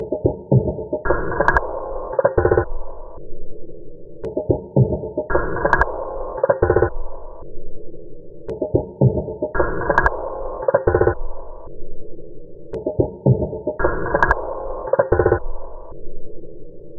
Instrument samples > Percussion
This 113bpm Drum Loop is good for composing Industrial/Electronic/Ambient songs or using as soundtrack to a sci-fi/suspense/horror indie game or short film.
Drum, Industrial, Samples, Soundtrack, Loop, Packs, Weird, Loopable, Dark, Underground, Ambient, Alien